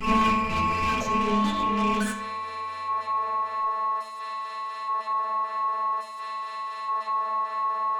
Sound effects > Electronic / Design
Building a Reindeer Robot
abstract, native-instruments-absynth, christmas-sound-design, noise, Christmas-themed, absynth, sound-design